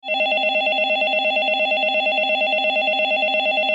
Sound effects > Objects / House appliances
Phone ringing synth 1
alert, ringing, landline, synth, office, telephone, ring, call, business, phone, work